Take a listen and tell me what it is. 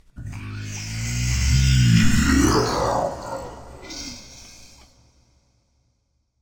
Sound effects > Experimental
Creature Monster Alien Vocal FX (part 2)-042
weird, Monster, demon, Sfx, fx, zombie, growl, Creature, mouth, otherworldly, snarl, gross, grotesque, bite, howl, Alien, dripping, devil